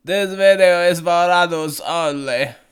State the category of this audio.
Speech > Solo speech